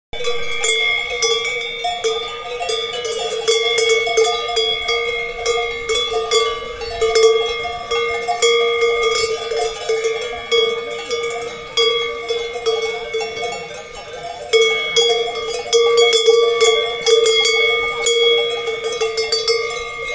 Soundscapes > Nature
campanacci mucche (cow bells)
sound of cows bells in mountain field recorded with my cellphone.
cell-phone, field-recording